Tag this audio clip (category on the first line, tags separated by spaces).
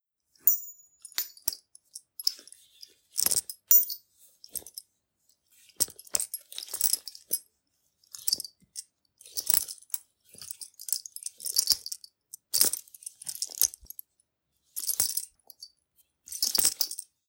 Sound effects > Human sounds and actions
equipment army feet knight foley baldric harness outside foot armour stepping footsteps medieval armor steps military straps patrol footstep keys work cling step walking kit guard mountaineering escalade heavy walk